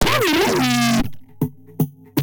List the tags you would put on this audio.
Sound effects > Electronic / Design
Loopable Robot Chaotic Tone Pulse Robotic Analog Electronic IDM DIY Mechanical Noise FX Crazy SFX Weird Experimental Saw Alien Oscillator Theremin Synth strange EDM Impulse Otherworldly Electro Machine Gliltch

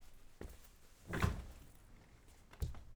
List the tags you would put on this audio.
Sound effects > Objects / House appliances
falling furniture home human movement sofa

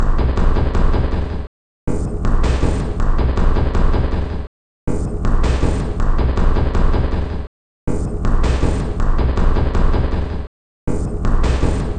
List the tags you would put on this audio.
Instrument samples > Percussion
Dark Soundtrack Alien Underground Loopable Industrial Ambient Samples Drum Loop Packs Weird

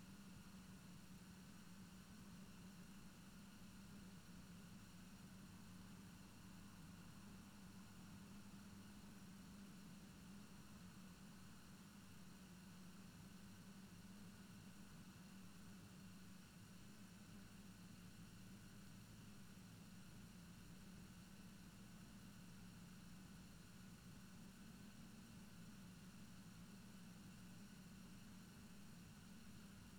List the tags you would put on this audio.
Soundscapes > Nature
alice-holt-forest meadow nature phenological-recording soundscape

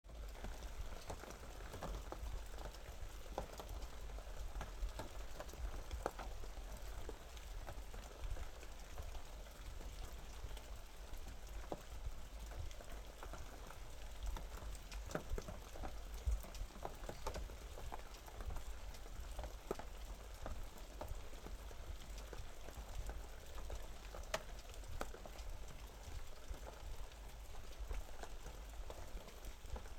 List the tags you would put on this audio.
Soundscapes > Nature
3D ambience ambisonics spatial

